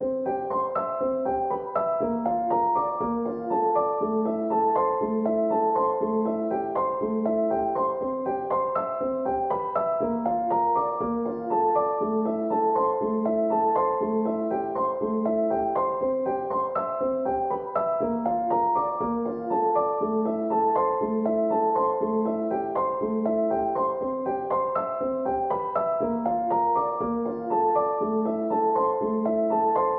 Music > Solo instrument
Piano loops 196 octave short loop 120 bpm
120bpm, 20, free, loop, music, piano, pianomusic, reverb, samples, simple, simplesamples